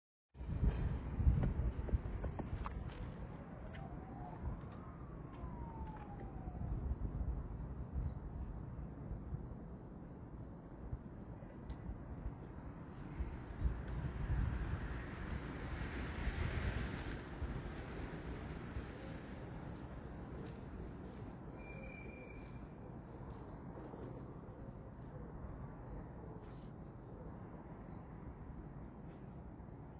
Soundscapes > Nature
This is a sound extracted from my original video that captures the raw beauty and authenticity of everyday suburban nature: 🌳 A mix of trees gently dancing in the wind ☁️ Swiftly moving clouds, with sunshine rising and falling on the leaves 🐦 Birds perching and singing throughout 👩‍👦 Kids and mom playing in the garden 🚗 Passing cars on the nearby road 🚉 Ambient sounds from the tram station close by 💨 Strong wind rustling through the trees 🚁 A helicopter passing overhead 🐄🐎 Various animal sounds — birds, cows, horses, and more Enjoy this 3:30 hours of uncut, unedited ambience filmed from a balcony overlooking a suburban, forest-like backyard with my phone (Samsung Galaxy s22). Whether for relaxation, study background, sleep ambience, or simply mindfulness, this sound provides an authentic slice of suburban life with forest vibes. Thank you and enjoy!